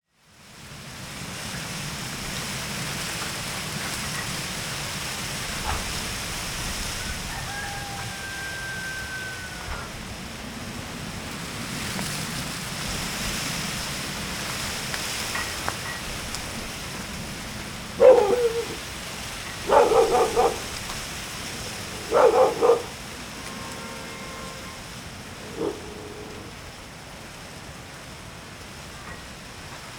Soundscapes > Nature
Gyodong Island Afternoon – Rural Village Soundscape, Korea
UID: KR-GYODONG-20251122-1640-001 Recorded near Gyodong-eupseong on Gyodong Island, Korea. Rural village soundscape with chickens crowing, dogs barking, occasional car horns, tree leaves moving in the island wind, and small metallic sounds from flag lines swaying.
korea, village, gyodong, wind, chickens, metal, rural, island, dogs, field-recording